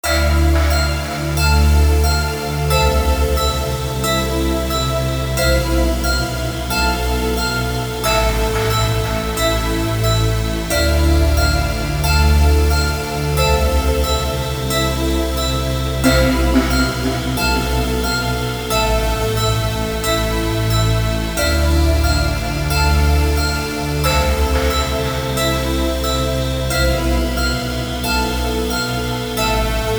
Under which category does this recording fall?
Music > Multiple instruments